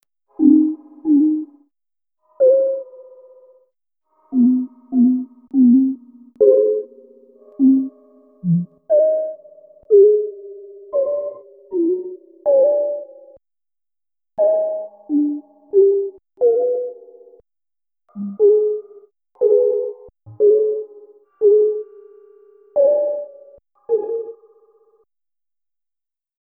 Instrument samples > Synths / Electronic
Bendy Circuit Lofi Key
All sounds sourced from this event are original recordings made by the participants or organizers (no uncleared samples).
lofi, retro, bendy, analog, key, soft, circuits, fm